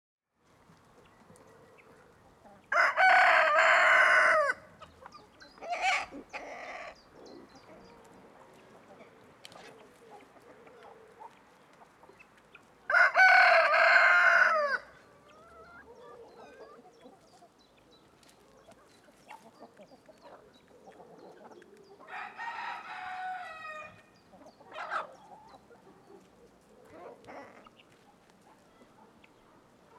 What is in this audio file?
Sound effects > Animals
Polish village - rooster ,is perfect for cinematic uses,video games. Effects recorded from the field.
agriculture, ambience, animal, bird, countryside, crowing, dawn, domestic, farm, livestock, morning, natural, poultry, rooster, rural, village, wake-up